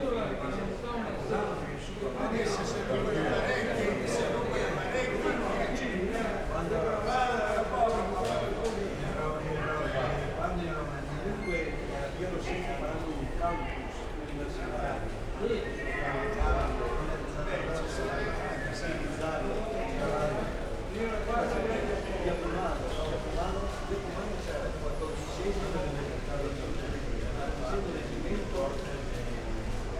Soundscapes > Urban
Trieste Mercato Coperto
The walla of some elders having a lively discussion in the little cafeteria of the "Mercato Coperto", the covered market of Trieste, Italy, winter 2025. AB omni stereo, recorded with 2 x EM272 Micbooster microphones & Tascam FR-AV2
conversation, elders, group-talking, italia, italy, market, mercado, mercato-coperto, trieste, walla